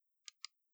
Sound effects > Objects / House appliances
Computer Mouse Click Free
A simple mouse click.
button, click, computer, computer-mouse, mouse, press, short, synthetic